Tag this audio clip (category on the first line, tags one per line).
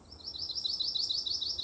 Sound effects > Animals
forest
nature
warbler